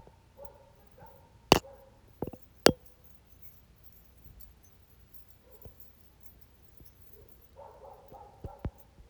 Sound effects > Experimental
METLTonl wind chime sound DOI FCS2
Metal wind chimes sound